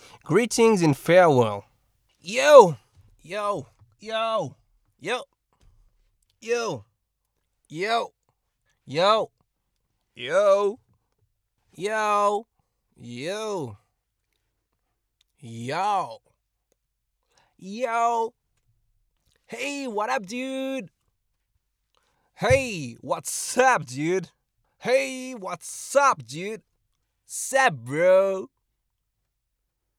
Speech > Solo speech
Surfer dude - kit - Greetings kit
Subject : Recording my friend going by OMAT in his van, for a Surfer like voice pack. Date YMD : 2025 August 06 Location : At “Vue de tout Albi” in a van, Albi 81000 Tarn Occitanie France. Shure SM57 with a A2WS windshield. Weather : Sunny and hot, a little windy. Processing : Trimmed, some gain adjustment, tried not to mess too much with it recording to recording. Done in Audacity. Some fade in/out if a one-shot. Notes : Tips : Script : "Yo!" "Heyyy, what’s up, dude?" "Sup, bro?" "WHAT’s up duude?" "Ayy, long time no vibe!" "Later, man." "Catch ya on the flip tide." "Peace, dude." "Oh my god duuude it’s been so long, I missed you bro."
2025, 20s, A2WS, Adult, August, Cardioid, Dude, English-language, France, FR-AV2, greetings, hello, hi, In-vehicle, kit, Male, mid-20s, Mono, pack, RAW, Single-mic-mono, SM57, Surfer, Tascam, VA, Voice-acting